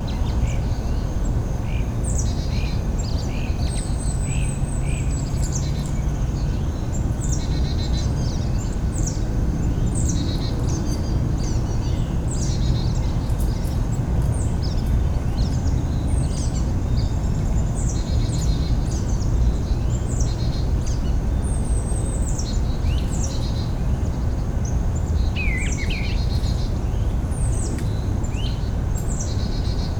Soundscapes > Urban
Early morning along the Intracoastal Waterway. Distant bridge and neighborhood traffic. Birdsong, crickets. 6AM